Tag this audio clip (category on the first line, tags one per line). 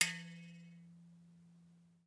Sound effects > Other mechanisms, engines, machines
boing
garage